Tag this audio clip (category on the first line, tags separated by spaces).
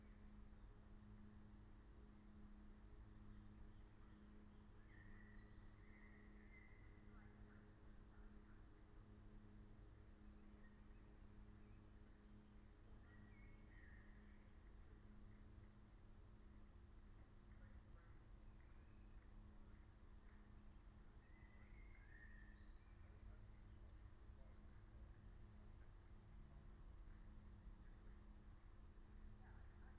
Soundscapes > Nature
meadow raspberry-pi field-recording